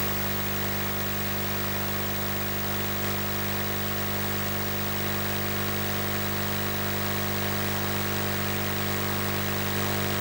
Sound effects > Other
Analog video hum 2
Recording of background noise signal that a Europhon CRT received from UHF frequency from my transmitter, recorded via direct line out from the CRT into the Zoom H1n. My transmitter did not send any audio signal, so the following sound was mainly produced by external interference and the electronics in the transmitter and receiver. This is the second analog video hum in the VHS/VCR pack.